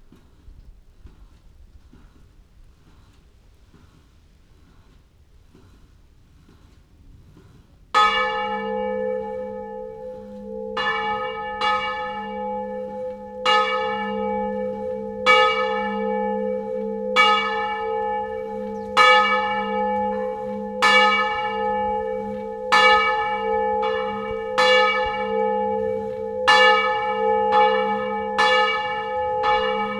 Soundscapes > Urban
Subject : Recording the church bells in Gergueil. Date YMD : 2025 August 31. Sennheiser MKE600 with stock windcover. P48, no filter. A manfroto monopod was used. Weather : Probably windy and a not far from raining. Processing : Trimmed and normalised in Audacity.